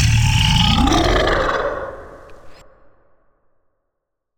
Sound effects > Experimental
Creature Monster Alien Vocal FX (part 2)-004
Alien, bite, Creature, demon, devil, dripping, fx, gross, grotesque, growl, howl, Monster, mouth, otherworldly, Sfx, snarl, weird, zombie